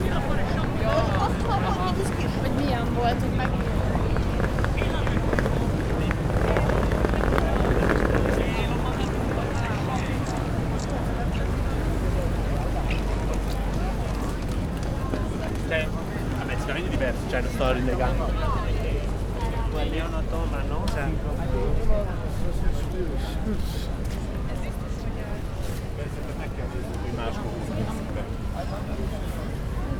Soundscapes > Indoors
People chatting. You can hear voices of them like in a crowd Sound recorded while visiting Biennale Exhibition in Venice in 2025 Audio Recorder: Zoom H1essential